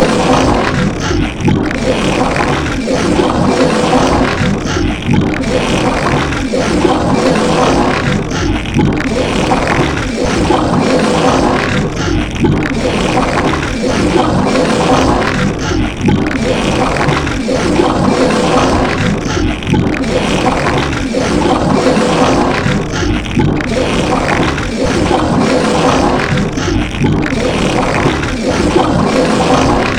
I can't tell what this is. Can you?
Sound effects > Human sounds and actions
burpfart biomachine 1 - premix 3
pass-gas, bubble-up, exhale, vomit, flatulate, fart, break-wind, rift, let-one-slip, air-biscuit, eruct, cut-the-cheese, diarrhea, poot, hiccup, death-metal, burp, discharge, rip, cesspit, belch, toot, expel, gurgle, one, regurgitate